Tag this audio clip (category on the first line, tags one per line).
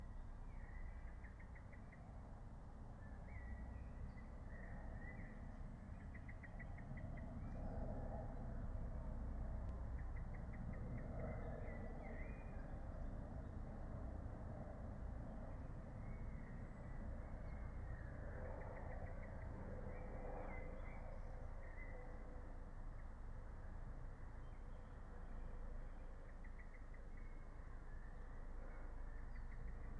Soundscapes > Nature
nature
raspberry-pi
soundscape